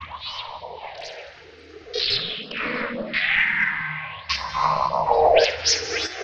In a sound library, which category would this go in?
Soundscapes > Synthetic / Artificial